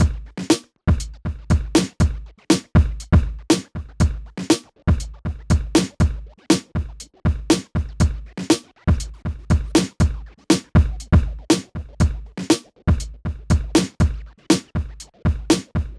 Instrument samples > Percussion
weird spacey techno kit drum loop (120bpm)
All the free wavs in this pack are Ableton drum racks that I've cut into loops and exported from old beats that never left my computer. They were arranged years ago in my late teens, when I first switched to Ableton to make hip-hop/trap and didn't know what I was doing. They are either unmixed or too mixed with reverb built in. Maybe I'm being too harsh on them. I recommend 'amen breaking' them and turning them into something else, tearing them apart for a grungy mix or layering to inspire pattern ideas. That's what I love doing with them myself.
120bpm, drum, drums, kit, loop, sample, spacey, techno